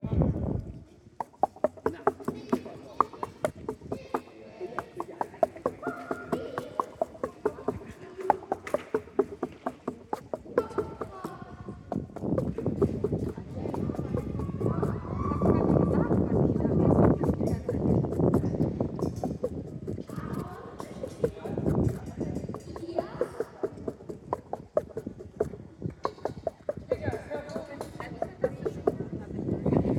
Soundscapes > Urban
Teufelsberg hollow frame
knocking my knuckles on the frame of one of the Teufelsberg domes in a rhythmic manner
dome, germany, hollow, knock, knocking, knuckles